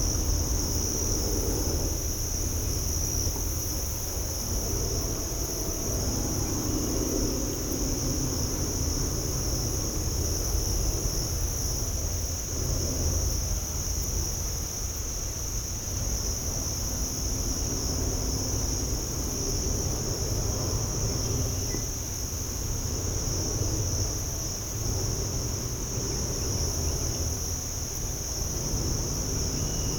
Soundscapes > Nature
AMBSwmp-Summer early morning marshland, Oyster Bay, crickets, birds, 6AM QCF Gulf Shores Alabama Zoom H2n Surround Binaural Mixdown
Dawn in Marsh leading to Oyster Bay, Alabama, summer, birds, insects, crickets, morning.
birds, field-recording, morning, summer, nature, crickets, marshland